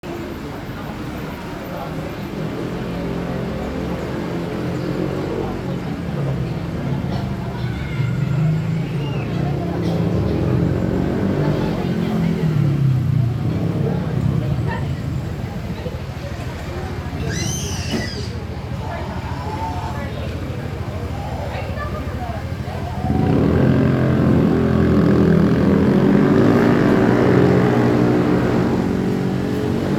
Soundscapes > Urban
Camp John Hay - General Ambience 4
This audio recording is included in the Camp John Hay Sounds Collection for the General Ambience Series pack. This recording, which immerses listeners in an authentic and richly textured soundscape, was done at Camp John Hay, a historic, pine-forested former U.S. Military Base in Baguio, Philippines, which has now been converted into a popular mixed-use tourist destination. The recording was made with a cellphone and it caught all the elements of nature and humans present at the site, such as the gentle rustling of pine needles swaying with the wind, birds calling from afar, visitors walking on gravel pathways, conversations being discreetly carried out, leaves flapping from time to time, and the quiet atmosphere of a high-up forested environment. These recordings create a very lifelike atmosphere that is very wide in usage from teaching to artistic work, and even in the background to just relaxing.
audio, background, cinematic, game, natural, outdoor, quiet, recording, sound, tourist, trees